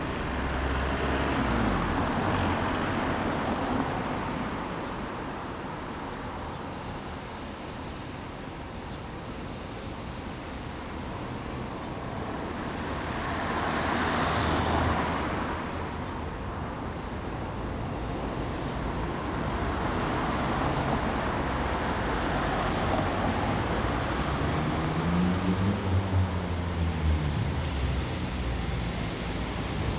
Soundscapes > Urban
2025 04 11 08h28 - Perpignan Saint-Assiscle - Rondpoint et autolaveuse
Subject : Close to a roundabout in Saint-assiscle, a street-cleaner passing by. Date YMD : 2025 04 11 08h28 Location : Saint-Assiscle France. Hardware : Zoom H2n. Weather : Processing : Trimmed and Normalized in Audacity. Notes : Facing west.
Perpignan, round-about, roundabout, Saint-assiscle, Street-cleaner